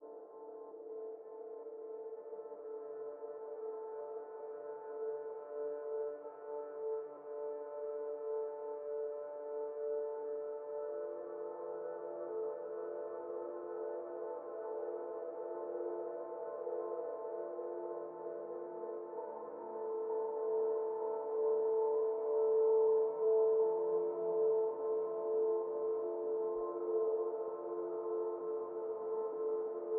Soundscapes > Synthetic / Artificial
Before The Northern Lights (Ambient)
made with Image-Line SAKURA+a lot of various lo-fi, distortion, reverbs, delays effects have been added to the sound Experimental Ambient sound
aurora-borealis, atmosphere, ambiance, drone, polar, experimental